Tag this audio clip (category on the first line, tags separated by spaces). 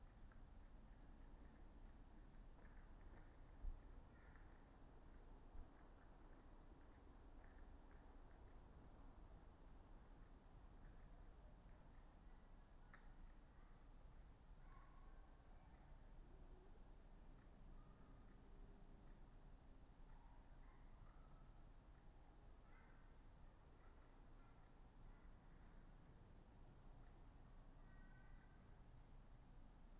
Soundscapes > Nature
alice-holt-forest natural-soundscape sound-installation raspberry-pi Dendrophone weather-data phenological-recording data-to-sound soundscape modified-soundscape artistic-intervention nature field-recording